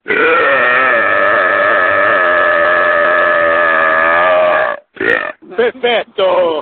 Speech > Other
Huge Burp
My friend Burped so loud that his stomach almost exploded. Recorded with my old mobile phone about 20 years ago